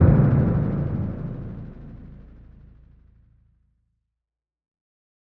Sound effects > Electronic / Design
IMMERSED RUMBLY HIT
DEEP, EDITING, HUGE, HIT, MOVIE, RUMBLING, GRAND, IMPACT, LOW, BOOM, BASSY, CINEMATIC, EXPLOSION, RATTLING